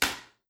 Sound effects > Objects / House appliances
CLOTHImpt-Blue Snowball Microphone, CU Glove Slap Nicholas Judy TDC
A glove slap.